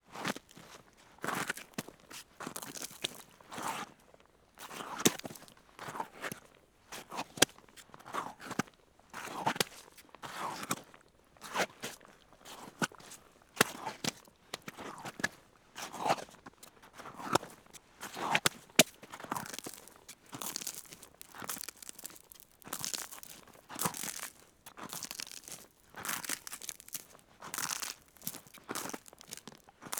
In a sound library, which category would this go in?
Sound effects > Human sounds and actions